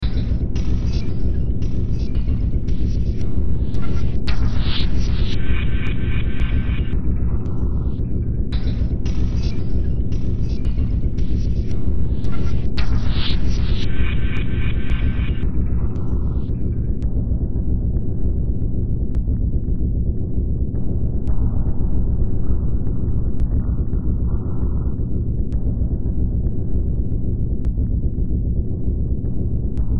Music > Multiple instruments
Demo Track #3373 (Industraumatic)
Ambient, Cyberpunk, Games, Horror, Industrial, Noise, Sci-fi, Soundtrack, Underground